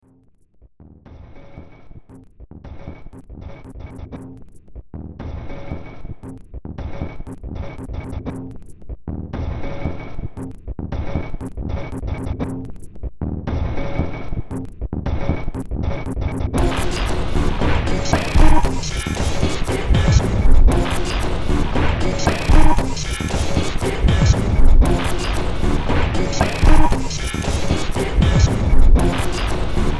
Music > Multiple instruments
Demo Track #3541 (Industraumatic)
Ambient, Games, Horror, Industrial, Sci-fi, Soundtrack